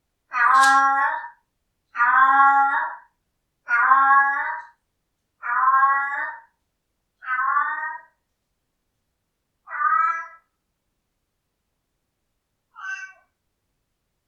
Sound effects > Animals
Siamese Cat Yowls
My Siamese cat, Sydney, doing a concert in the bathtub. He loved the echo effect. I think that last note was his comment, "OK, That wasn't too bad." Recorded with an iphone, room tone cut down with Audacity.